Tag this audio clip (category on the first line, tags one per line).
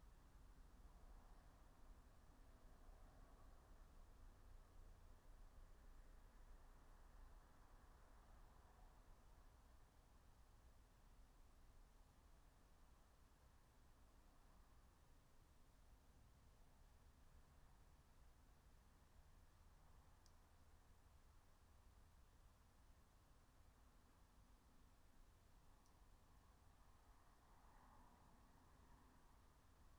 Soundscapes > Nature
nature
field-recording
soundscape
alice-holt-forest
raspberry-pi
natural-soundscape
phenological-recording